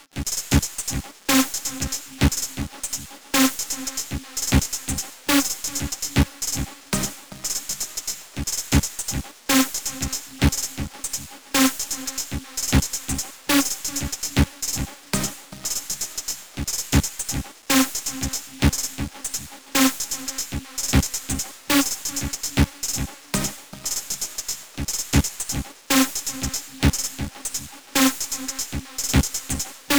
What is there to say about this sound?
Music > Multiple instruments
117 - Scream Percussions
synth percussion, not quantized, with a bit of room. I guess this is a cableguys noise (Hihat) triggered by a regular synth.